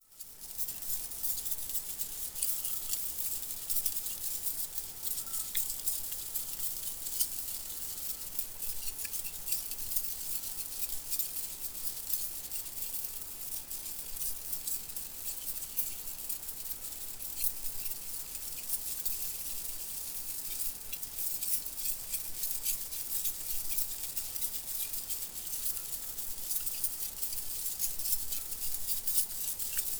Sound effects > Objects / House appliances
CHAINMvmt-Blue Snowball Microphone, MCU Chains, Rattling, Rustling Nicholas Judy TDC
Chains rattling and rustling.